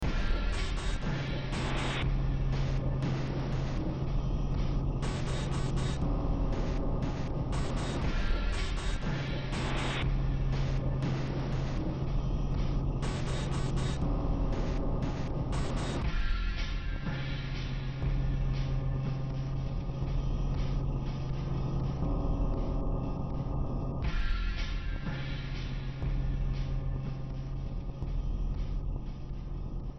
Music > Multiple instruments
Demo Track #3860 (Industraumatic)
Ambient, Games, Industrial, Sci-fi, Soundtrack, Underground